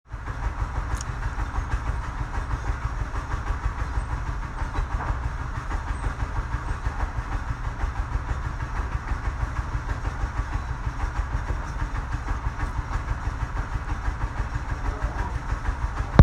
Sound effects > Other mechanisms, engines, machines
Động Cơ Nhỏ Cho Cưa Cây - Small Engine
Small engine for cut wood. Record use iPhone 7 Plus smart phone 2025.12.30 14:46
engine, machine, beat, motor